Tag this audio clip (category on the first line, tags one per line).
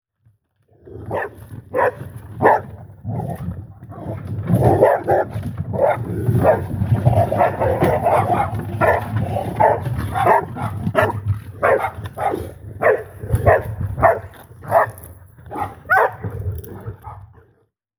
Soundscapes > Nature
Growling
Barking
Dogs